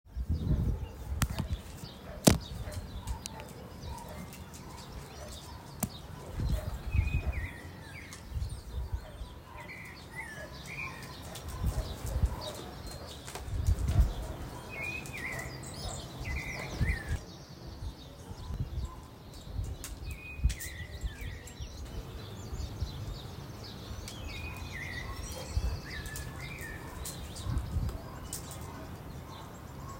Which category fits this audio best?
Soundscapes > Nature